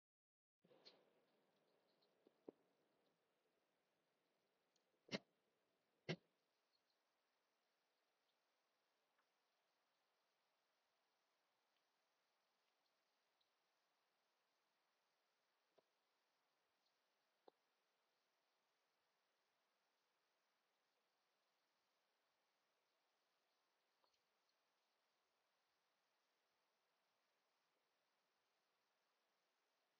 Soundscapes > Urban
Tokyo - Sakurayama shrine
Ambience Recording from Sakurayama Shrine in central Tokyo. 15/1/2025
Ambience, tokyo, shrine, quiet, peaceful, fieldrecording